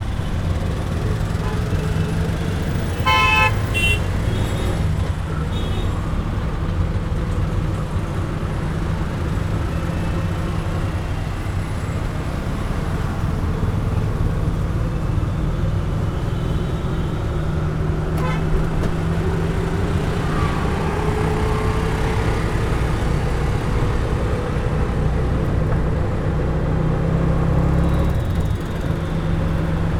Soundscapes > Urban
AMB CITY INDIA BENGALORE Ride in TukTuk 3 (48 24)12.2024
CAR, CITY, HORN, INDIA, STREET, TRAFFIC, TUKTUK, URBAN